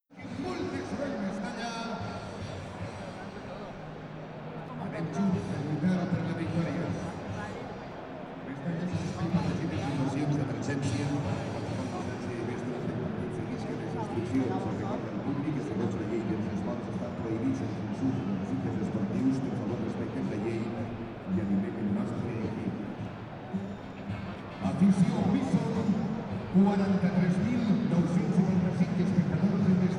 Sound effects > Human sounds and actions
#05:07 Segundo gol del Valencia (Hugo Duro; minuto 51 de partido) #08:14 Tercer gol del Valencia (Hugo Duro; minuto 53 de partido) #11:32 Cuarto gol del Valencia (Diego López; minuto 56 de partido) Second half of the match played by Valencia C.F. in Mestalla Stadium. It was a post Dana match, against Betis. Valencia won 4 to 2. Recorded using the Sony PCM M10 internal mics in Low Gain setting. With gain between 2 and 3. I still had to tweak the hottest parts with Izotope RX 11. ····················································· Segunda parte del partido del Valencia C.F. en el Mestalla. Partido post DANA, contra el Betis. Ganó el Valencia 4 a 2. Grabado con los micros internos de la Sony PCM M10 en configuración LOW Gain. Con la rosca entre el 2 y 3. Aún así he tenido que de clipar algún momento con el Izotope RX 11. Exportado en Protools a 16bits para poder subirlo aquí (limita a 1GB).